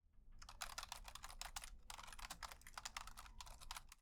Sound effects > Electronic / Design
the clicking and tapping of a keyboard